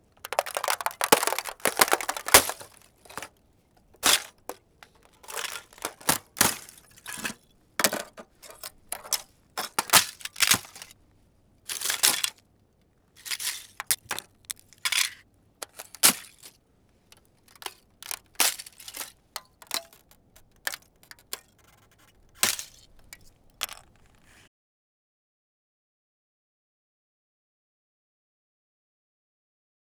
Sound effects > Natural elements and explosions
Recorded that sound by myself with Recorder - H1 Essential